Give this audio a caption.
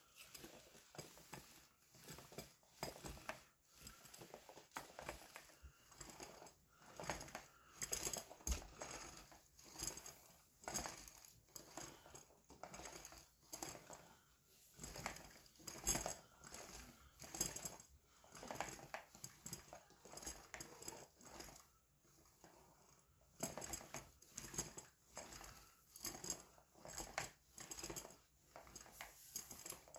Sound effects > Objects / House appliances
OBJHsehld-Samsung Galaxy Smartphone, MCU Paint Roller Nicholas Judy TDC
A paint roller rolling.
foley, paint, paint-roller, Phone-recording, roll, roller